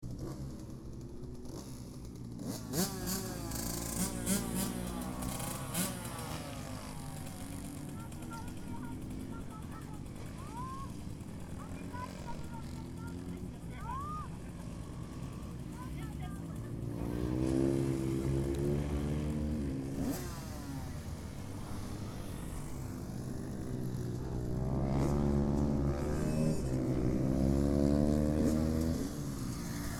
Soundscapes > Other
Supermoto Polish Championship - May 2025 - vol.8 - Racing Circuit "Slomczyn"
Recorded on TASCAM - DR-05X; Field recording on the Slomczyn racetrack near Warsaw, PL; Supermoto Championship. I got closed for this recording, near the racetrack entrance to take the sound in, you can hear it moving right to left.